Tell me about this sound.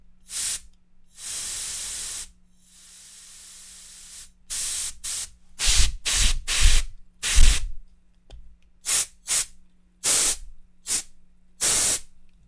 Sound effects > Objects / House appliances
Compressed air spray
The sounds from a can of compressed air being sprayed near a TalkGo mic, recorded in OBS and converted in Shotcut. This sound is original and was not taken from anywhere else.
can, burst, air